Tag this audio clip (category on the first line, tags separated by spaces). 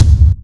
Instrument samples > Percussion
bongo Tama bass drums Sakae 16x16 Pearl floor bata deepbass Mapex dundun strike ashiko Gretsch Premier tom-tom Yamaha Ludwig floortom DW Sonor deeptom drum PDP djembe basstom tom hit bougarabou